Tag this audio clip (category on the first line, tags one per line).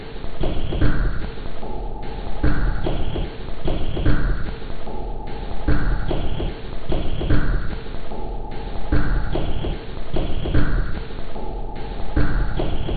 Instrument samples > Percussion
Samples
Drum
Weird
Loopable
Loop
Ambient
Packs
Industrial
Soundtrack
Underground
Alien
Dark